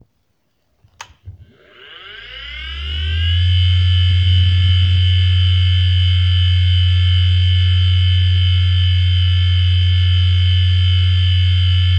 Sound effects > Objects / House appliances
hd-on
A broken hard disk spinning powered on.